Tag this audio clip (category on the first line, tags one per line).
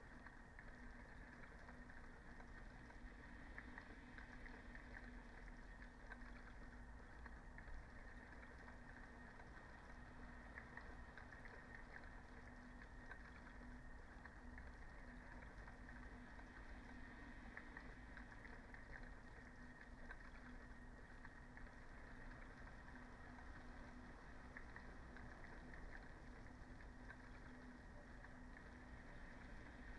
Soundscapes > Nature
alice-holt-forest
Dendrophone
modified-soundscape
nature
weather-data